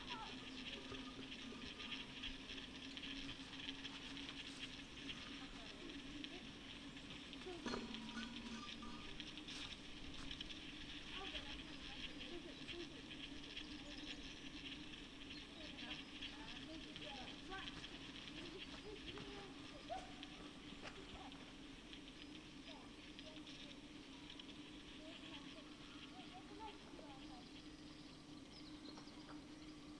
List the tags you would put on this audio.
Soundscapes > Nature
phenological-recording alice-holt-forest Dendrophone nature field-recording weather-data sound-installation soundscape raspberry-pi modified-soundscape artistic-intervention data-to-sound natural-soundscape

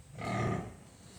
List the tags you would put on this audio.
Sound effects > Animals
barnyard farm hog livestock pig swine

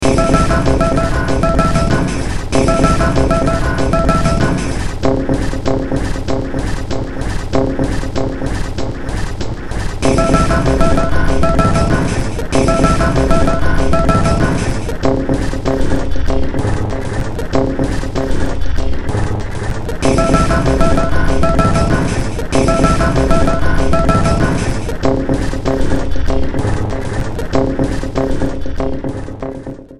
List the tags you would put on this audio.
Music > Multiple instruments
Underground Soundtrack Games Ambient Horror Noise Industrial Sci-fi Cyberpunk

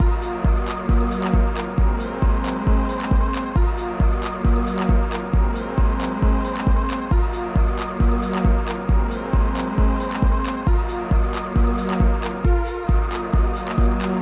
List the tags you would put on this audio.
Soundscapes > Synthetic / Artificial
boombox melody progression synth

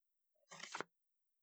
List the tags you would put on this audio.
Sound effects > Objects / House appliances
dagger sheath

